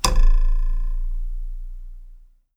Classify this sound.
Sound effects > Objects / House appliances